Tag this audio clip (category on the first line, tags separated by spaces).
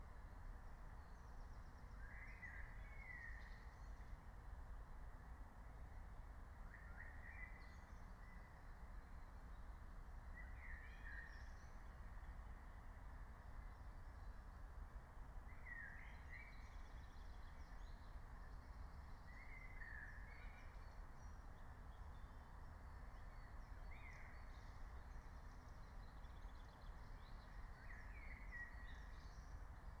Soundscapes > Nature
raspberry-pi natural-soundscape nature field-recording alice-holt-forest phenological-recording meadow